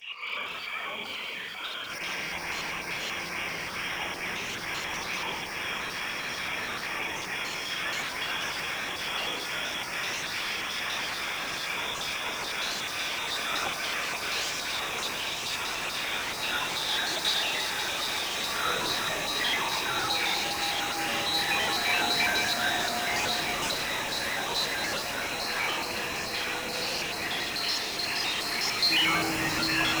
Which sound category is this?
Sound effects > Electronic / Design